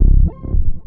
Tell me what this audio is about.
Instrument samples > Synths / Electronic
CVLT BASS 25
bass, clear, drops, lfo, low, sub, subbass, subs, subwoofer, synth, synthbass, wavetable